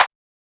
Instrument samples > Percussion
8 bit-Noise Clap3
game, FX, percussion, 8-bit